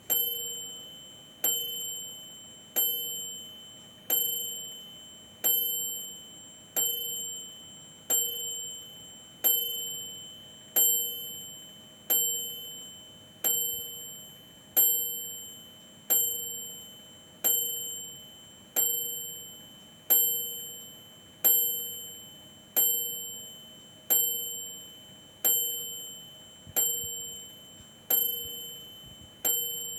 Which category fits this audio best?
Sound effects > Objects / House appliances